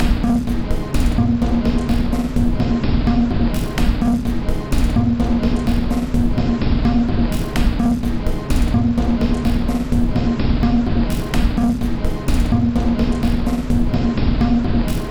Instrument samples > Percussion
This 127bpm Drum Loop is good for composing Industrial/Electronic/Ambient songs or using as soundtrack to a sci-fi/suspense/horror indie game or short film.
Dark, Loopable, Underground, Weird, Drum, Samples, Industrial, Soundtrack, Alien, Packs, Loop, Ambient